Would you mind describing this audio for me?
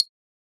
Sound effects > Objects / House appliances
Jewellerybox Shake 10 Tone
Shaking a ceramic jewellery container, recorded with an AKG C414 XLII microphone.
trinket-box, jewellery, jewellery-box